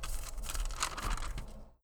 Sound effects > Objects / House appliances
PAPRHndl-Samsung Galaxy Smartphone, MCU Newspaper, Page Flip Nicholas Judy TDC
A newspaper page flip.
flip, newspaper, page, foley, Phone-recording